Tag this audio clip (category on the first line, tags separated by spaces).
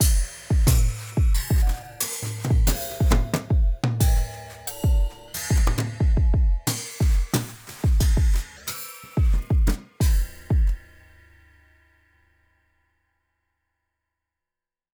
Music > Multiple instruments
Groove Beats Loops Downtempo EDM IDM TripHop Heavy Groovy Percussion Bass Loop Subloop Trippy Sample Bassloop Perc Soul Melodies Chill Beat Funky Hip FX HipHop Hop Sub Melody